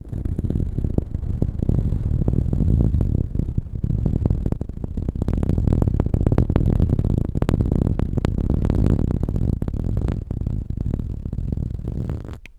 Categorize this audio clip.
Sound effects > Objects / House appliances